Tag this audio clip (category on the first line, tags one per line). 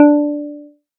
Instrument samples > Synths / Electronic
additive-synthesis
fm-synthesis
pluck